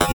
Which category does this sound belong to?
Sound effects > Electronic / Design